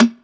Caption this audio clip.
Instrument samples > Percussion
Hyperrealism V9 Rimshot
digital, drum, drums, machine, one-shot, sample, snare, stereo